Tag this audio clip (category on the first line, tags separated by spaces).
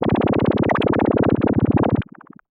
Sound effects > Experimental
electro sweep machine trippy dark mechanical fx robotic korg electronic retro scifi robot sample bassy complex sci-fi vintage analog bass analogue pad alien effect oneshot weird synth snythesizer basses sfx